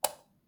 Sound effects > Objects / House appliances
Switch off 1
Simple sound effect of me pressing my bedroom light switch, it has a little echo in the background but can be removed by using an audacity plugins.
button, click, press, switch